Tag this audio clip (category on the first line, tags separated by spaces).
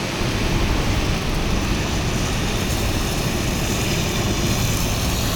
Sound effects > Vehicles
bus transportation vehicle